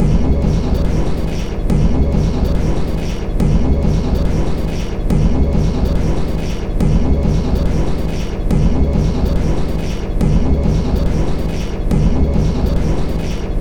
Instrument samples > Percussion
This 141bpm Drum Loop is good for composing Industrial/Electronic/Ambient songs or using as soundtrack to a sci-fi/suspense/horror indie game or short film.
Loop, Ambient, Underground, Drum, Samples, Industrial, Loopable, Packs, Weird, Soundtrack, Alien, Dark